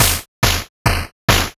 Instrument samples > Percussion
[CAF8bitV2]8-bit Snare1-#A Key-Dry&Wet
8-bit, 8bit, Game, Snare